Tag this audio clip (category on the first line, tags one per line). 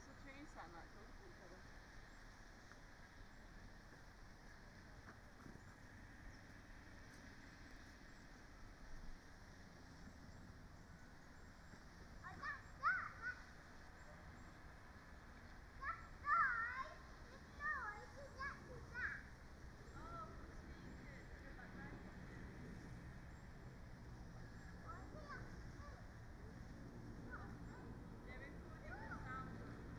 Soundscapes > Nature
alice-holt-forest
natural-soundscape
sound-installation